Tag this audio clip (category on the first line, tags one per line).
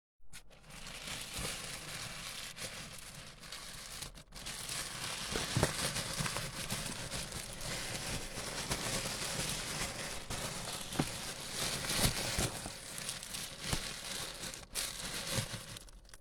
Sound effects > Objects / House appliances
crumble-plastic-bag
plastic-bag
bin-bag